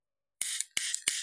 Sound effects > Objects / House appliances
lighters are great for lighting things quickly and easily. You can use them for birthday candles, holiday decorations and many other things. I have created this sound by myself and its not AI generated